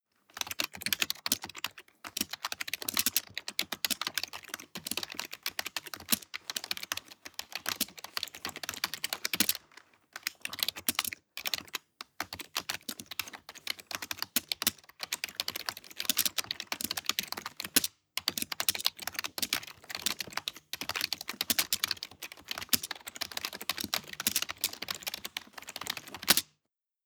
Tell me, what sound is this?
Sound effects > Objects / House appliances
CMPTKey Cinematis RandomFoleyVol5 Peripherals Keyboard Generic Typing Fast
Urgent, percussive, rapid typing with a crisp mechanical attack. This is one of the several freebies from my Random Foley | Vol.5 | Peripherals | Freebie pack.
clicky, fast, foley, keyboard, mechanical, percussive, peripheral, rhythmic, typing, urgent